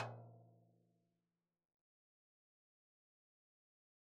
Music > Solo percussion
Med-low Tom - Oneshot 64 12 inch Sonor Force 3007 Maple Rack
flam, quality, real, roll